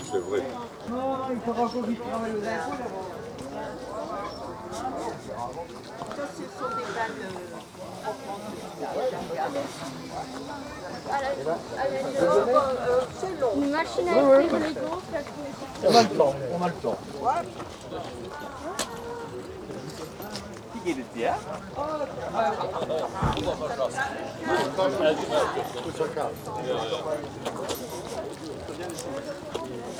Soundscapes > Urban
Description of Sound This recording captures the bustling ambient soundscape of a flea market in Wallonia. The sound is a dense tapestry of fragmented conversations in French, laughter, and the general murmur of a crowd. It is punctuated by specific, distinct sounds such as a person coughing, a dog's loud breathing, and the background clatter of everyday market activities, creating a vivid sense of a busy, lived-in space. Macro This is an ambient recording of a flea market in Wallonia. Meso The sound is characterized by the hustle and bustle of a crowd, with a constant low murmur of many conversations. Specific events include fragments of human conversation, people bargaining with vendors, and the occasional distinct sound like a person coughing or a dog breathing loudly. Micro The soundscape is a complex mix of overlapping human voices, with some words intelligible and others blending into a steady low-frequency hum.
ambience, people, wallonia, fleamarket, atmosphere, ambient